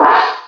Instrument samples > Percussion
gong rock 3
IT'S NOT A GONG! It's a bassless sample to be used in music.
Meinl, bronze, Zildjian, tamtam, Zultan, steel, China, cymbal, brass, metal, bell, Bosporus, metallic, tam-tam, percussion, disk, crash, cymballs, Istanbul, fake, gong, disc, Paiste, tam, Sabian, copper, chime